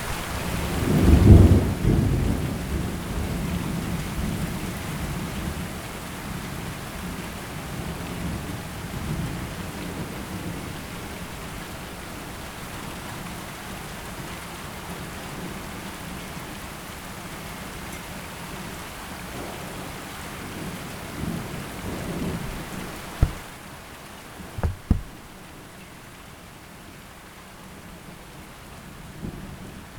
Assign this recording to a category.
Soundscapes > Nature